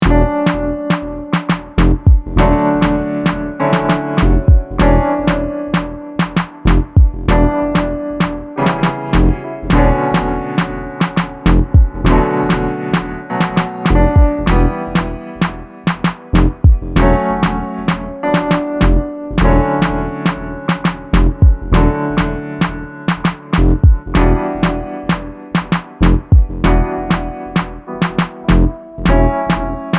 Music > Multiple instruments
Piano Playing with some Hip Hop Drums 102bpm
Made in FL11, i think piano is from EmulatorX and random drum samples.
live, hiphop, snare, reverbed, drums, chill, piano, space